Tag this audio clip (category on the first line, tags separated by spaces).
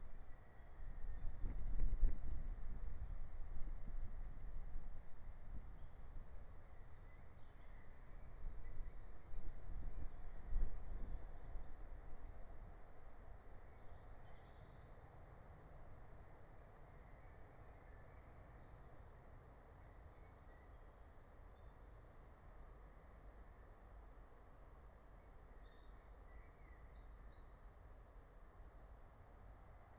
Soundscapes > Nature
phenological-recording raspberry-pi soundscape field-recording nature natural-soundscape alice-holt-forest meadow